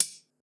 Instrument samples > Percussion
synthetic drums processed to sound naturalistic